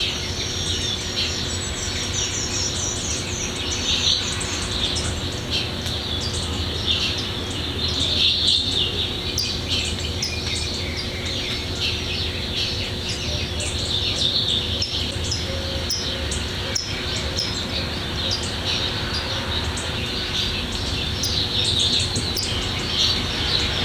Soundscapes > Nature
6AM California Birdsong
recorded in the early morning with a couple of Primo EM272s thru an H4- cleaned up with a little bit of EQ and compression to reduce the sharpness!
ambiance, ambience, ambient, atmosphere, background, background-sound, binaural, birds, field-recording, general-noise, nature, soundscape